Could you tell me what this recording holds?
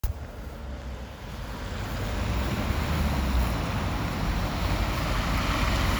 Soundscapes > Urban
A bus passing the recorder in a roundabout. The sound of the bus engine can be heard in the recording. Recorded on a Samsung Galaxy A54 5G. The recording was made during a windy and rainy afternoon in Tampere.